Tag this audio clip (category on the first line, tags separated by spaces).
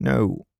Speech > Solo speech
Adult Calm FR-AV2 Generic-lines july mid-20s MKE-600 no sad Tascam Voice-acting